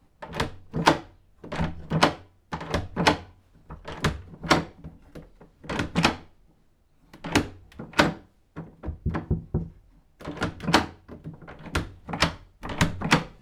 Sound effects > Objects / House appliances
Interior wooden door metal handle - Handle operation (multi-take)
Subject : Multiple takes of operating a door handle. Date YMD : 2025 04 19 Location : Indoor Gergueil France. Hardware : Tascam FR-AV2, Rode NT5 XY Weather : Processing : Trimmed and Normalized in Audacity.
2025, close, Dare2025-06A, Door, FR-AV2, handle, hinge, indoor, NT5, open, Rode, Tascam, XY